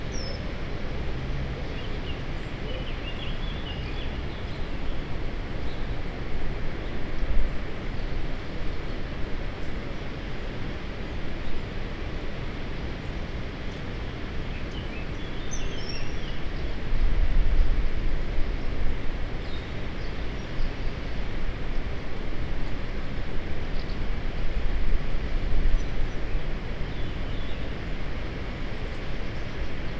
Soundscapes > Urban
Subject : Recording the Jardin Du Palais in Albi. Here facing the river to the north. Date YMD : 2025 July 25 09h29 Location : Albi 81000 Tarn Occitanie France. Soundman OKM-1 in ear binaural microphones. Weather : Light grey sky (with small pockets of light). A few breezes About 16°c Processing : Trimmed and normalised in Audacity.